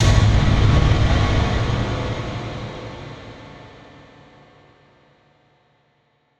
Sound effects > Experimental
Percussive Clang
Processed Recording of Stomping, layered, compressed, and reverbed. Then Layered the processed stomps, compressed and reverbed again. Sounds very Metallic for some reason.
Metal, Metall, Percussion